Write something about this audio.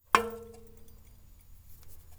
Sound effects > Other mechanisms, engines, machines
Woodshop Foley-066
bam, bang, boom, bop, crackle, foley, fx, knock, little, metal, oneshot, perc, percussion, pop, rustle, sfx, shop, sound, strike, thud, tink, tools, wood